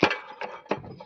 Sound effects > Objects / House appliances
Metal Object Falling
Dancing, Falling, Metal